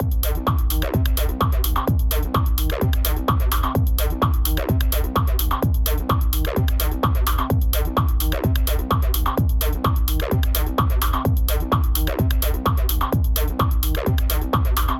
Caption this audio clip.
Instrument samples > Percussion
Techno drum loop 005
128bpm, audacity, bass, flstudio, kick, loop, sample, techno, tops